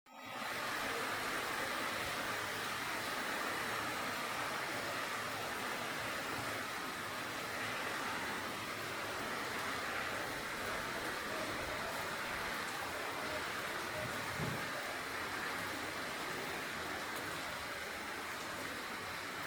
Sound effects > Natural elements and explosions
Rain drops
Recording the sound of rain from a mobile phone
Water, Drops, Nature